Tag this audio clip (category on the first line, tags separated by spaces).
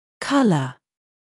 Speech > Solo speech
word voice pronunciation english